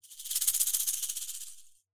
Instrument samples > Percussion
Dual shaker-009
percusive, recording, sampling